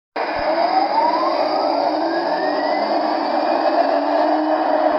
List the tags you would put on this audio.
Sound effects > Vehicles
traffic
tram
track
field-recording

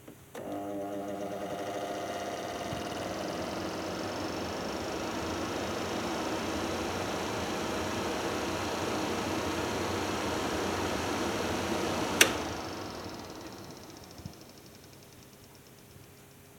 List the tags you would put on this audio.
Sound effects > Objects / House appliances
appliance
cooktop
electric
fan
kitchen
machine
motor

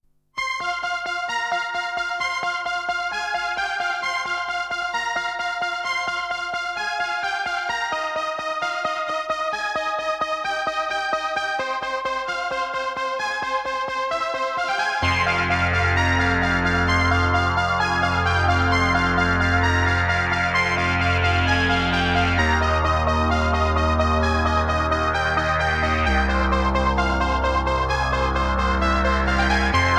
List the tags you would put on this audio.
Music > Multiple instruments
ai-generated
futuristic
game
synth

